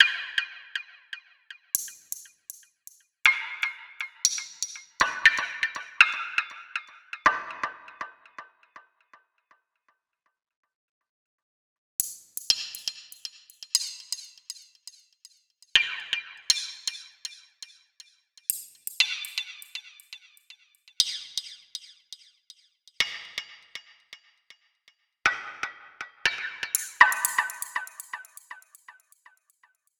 Soundscapes > Synthetic / Artificial
Space Glitch 002
Space Glitch Done with Digitakt 2
space, glitch, artificial, reverb